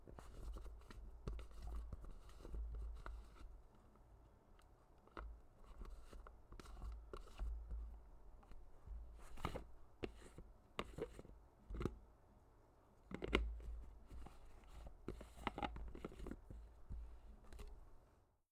Sound effects > Objects / House appliances
FOLYProp-Blue Snowball Microphone Camelbak Magnetic Quick Stow Cap, Opens, Closes Nicholas Judy TDC
A Camelbak magnetic quick stow cap opens and closes.